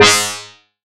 Instrument samples > Synths / Electronic
SLAPMETAL 1 Ab
additive-synthesis, bass, fm-synthesis